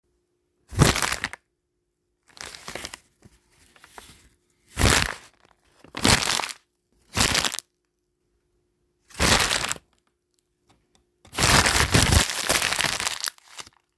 Sound effects > Objects / House appliances
Paper crumpling
Crumpling paper with hands